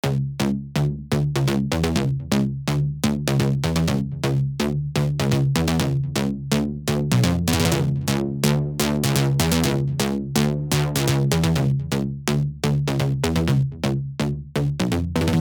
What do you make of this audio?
Music > Solo instrument
Ableton Live. VST....Serum...Bass 125 bpm Free Music Slap House Dance EDM Loop Electro Clap Drums Kick Drum Snare Bass Dance Club Psytrance Drumroll Trance Sample .
125, bpm